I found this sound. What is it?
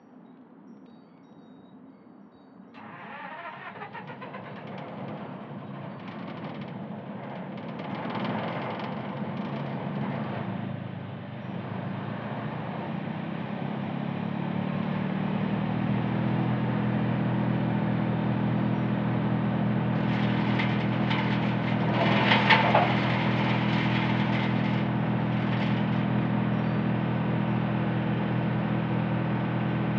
Soundscapes > Urban
Tree branches in a shredder. Recorded on phone.